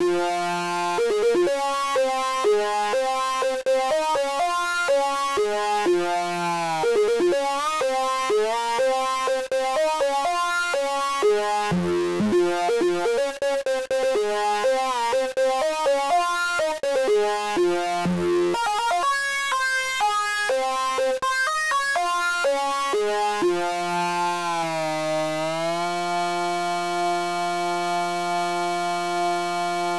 Music > Solo instrument
3-4 Scream lead - 123bpm Fm
I recommend adding a dab of chorus and reverb to it.
Minifreak, Lead, 123bpm